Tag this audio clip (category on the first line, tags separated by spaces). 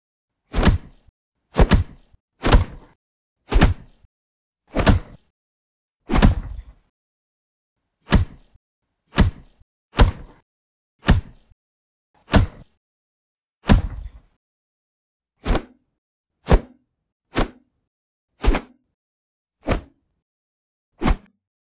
Sound effects > Human sounds and actions
bag hand box military fight hit fist punchingball judo melee boxing impact karate duel attack battle body-hit kick train punch agression hands body impacts hits army training punching